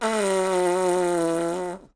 Sound effects > Human sounds and actions
FARTDsgn-Blue Snowball Microphone, MCU Low, Squeaky Nicholas Judy TDC
A low, squeaky fart.
squeaky, Blue-Snowball, low, fart, cartoon, Blue-brand